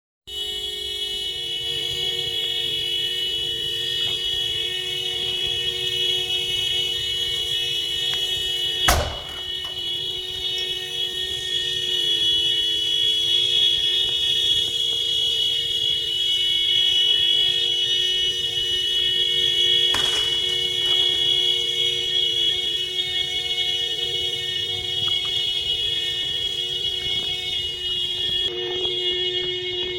Soundscapes > Urban
Car fire burning tires explosion horn
Sound of a car on fire at night : intense crackling flames, tires bursting one by one, and a continuous car horn that stops due to heat. Recorded from my apartment, at the window, with a smartphone microphone (Samsung Galaxy S22), across the street (I called the firemen immediately, just to be safe). Note : Audio comes from a video sped up x2, resulting in faster and slightly higher-pitched sounds.